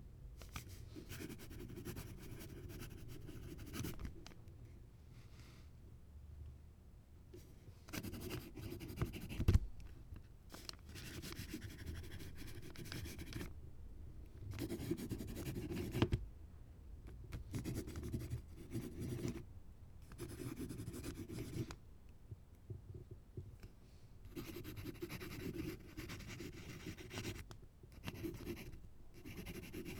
Sound effects > Objects / House appliances
Sounds of writing several words with a pencil on an old notebook with thin paper sheets, with some paper sounds. Recorded with Zoom H2.